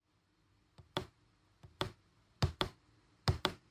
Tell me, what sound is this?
Sound effects > Other
Click sound effect 2
This sound is completely free and you can use it in any way you like.
gamesoundeffect, effect, sfx, tik, game, tok, click, sound, gamesound